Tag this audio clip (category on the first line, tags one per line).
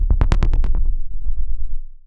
Instrument samples > Synths / Electronic
bass
bassdrop
clear
drops
lfo
low
lowend
stabs
sub
subbass
subs
subwoofer
synth
synthbass
wavetable
wobble